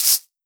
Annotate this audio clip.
Sound effects > Objects / House appliances
LoFi Scribble-01
Pencil on rough paper or parchment, or scratching on a rough, sandy surface. Foley emulation using wavetable synthesis.